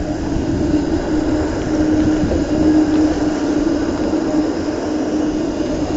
Soundscapes > Urban
Passing Tram 5
city, field-recording, outside, street, traffic, tram, trolley, urban